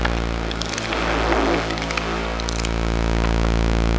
Sound effects > Electronic / Design
Industrial Estate 40
soundtrack, industrial, chaos, 120bpm, techno, loops, Ableton